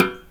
Sound effects > Other mechanisms, engines, machines
Handsaw Oneshot Metal Foley 17
twangy, twang, fx, percussion, household, vibration, plank, foley, metallic, smack, shop, vibe, hit, sfx, perc, metal, handsaw, tool, saw